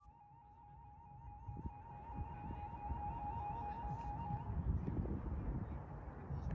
Sound effects > Other mechanisms, engines, machines
Alarm sounding as lock in floating harbour opens from further away and alarm stops.